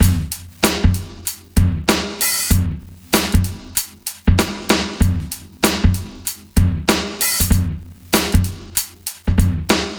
Music > Solo percussion
bb drum break loop tez 96
Lo-Fi, Drum-Set, Drums, 96BPM, Acoustic, Breakbeat, Drum, Dusty, Vinyl, Vintage, DrumLoop, Break